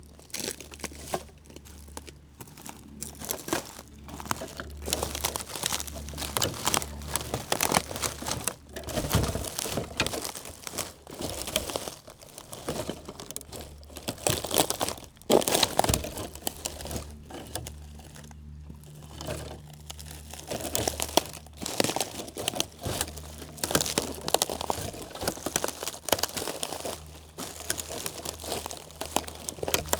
Soundscapes > Nature
Walking on Dry Crunchy Forest Floor (Bedgebury Forest)
📍 Bedgebury Pinetum & Forest, England 14.05.2025 11.39am Recorded using a pair of DPA 4060s on Zoom F6